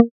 Instrument samples > Synths / Electronic
APLUCK 8 Bb
additive-synthesis, fm-synthesis, pluck